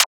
Instrument samples > Synths / Electronic
An abstract, swirly sound effect made in Surge XT, using FM synthesis.
electronic, fm, surge, synthetic